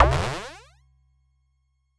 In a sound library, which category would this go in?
Sound effects > Electronic / Design